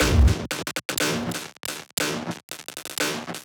Sound effects > Experimental
destroyed glitchy impact fx -015
impacts, laser, crack, fx, perc, abstract, sfx, glitch, idm, pop, percussion, edm, hiphop, alien, lazer, impact, otherworldy, glitchy, whizz, snap, zap, experimental, clap